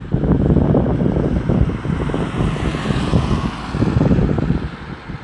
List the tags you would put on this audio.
Soundscapes > Urban
car
city

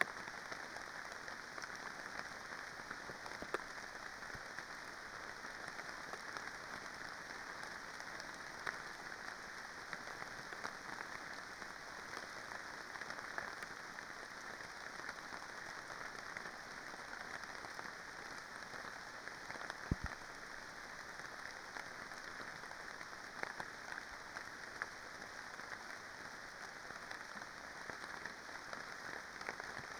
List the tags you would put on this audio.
Soundscapes > Nature
sound-installation
alice-holt-forest
natural-soundscape
Dendrophone
nature
artistic-intervention
modified-soundscape
data-to-sound
field-recording
raspberry-pi
weather-data
phenological-recording
soundscape

